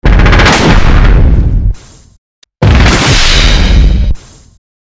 Sound effects > Experimental
Made this by messing with a stretched out drum sound and using tons of different automation clips in Fl Stuido. Enjoy